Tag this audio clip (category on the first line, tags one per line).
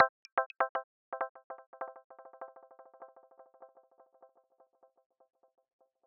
Sound effects > Electronic / Design
Botanica
Chord
Drewdrop
FX
Pluck
Water